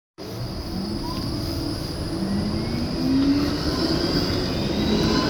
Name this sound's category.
Soundscapes > Urban